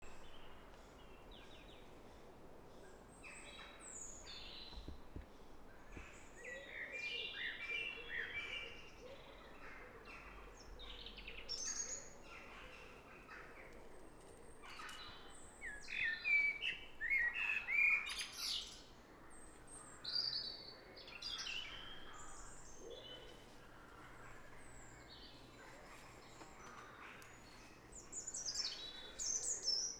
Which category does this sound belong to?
Soundscapes > Nature